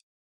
Music > Solo percussion
Med-low Tom - Oneshot 39 12 inch Sonor Force 3007 Maple Rack
beat, drums, flam, loop, maple, Medium-Tom, med-tom, perc, percussion, quality, realdrum, roll, Tom, toms, wood